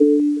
Sound effects > Electronic / Design
note D blip electro
from a scale of notes created on labchirp for a simon-type game of chasing sounds and flashes.